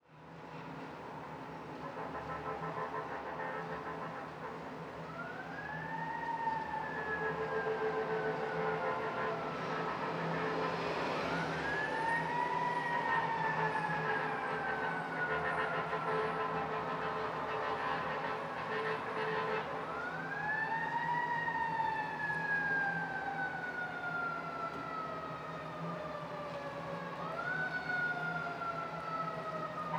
Soundscapes > Urban
An emergency vehicle comes from the distance and passes by with siren and honking. A bit later another one comes by as well.
urban, emergency, firetruck, siren, city, street, field-recording, ambulance, traffic, ambience